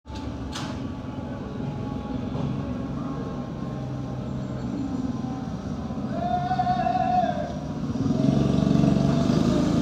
Soundscapes > Urban
Calle Francisco Morrobel. street sounds in the Dominican Republic. Luperon
This is a short recording of the street sounds in Luperon D.R
street, field-recording, street-noise, human